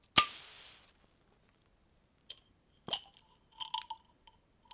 Sound effects > Objects / House appliances
Unsatisfying Beer Bottle Uncorking
Opening a bottle of Mönchshof Naturtrüb`s Alkoholfrei. Haters will say it's because it's alcohol free. I'll try to remember recording the next one to prove them wrong. Zoom H2n MS recording.
Beer, beer-bottle, beer-bottle-opening, Bottle, closure, disappointing, disappointment, dissatisfying, fail, failed, mishap, open, opening, opening-a-beer-bottle, swing-stopper, uncork, Uncorking, unfortunate, Unsatisfying